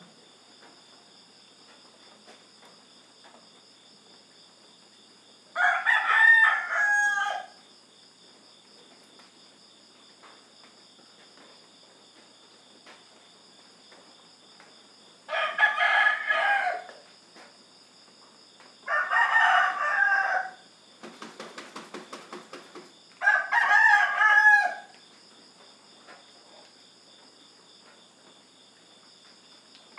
Sound effects > Animals
250719 051216 PH Roosters and light rain in the morning
Roosters, crickets, and light rain in the morning (loopable). Recorded from the window of a house located in the surroundings of Santa Rosa (Baco, Oriental Mindoro, Philippines)during July 2025, with a Zoom H5studio (built-in XY microphones). Fade in/out and high pass filter at 180Hz -48dB/oct applied in Audacity.
field-recording, rooster, outdoor, countryside, drops, Santa-Rosa, ambience, chicken, chickens, farm, rain, loop, Philippines, soundscape, roosters, loopable, cock-a-doodle-doo, atmosphere, dripping